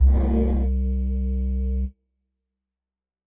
Sound effects > Other
A midi bass, made in Fl studio using midi library
Bass, Flstudio, Midi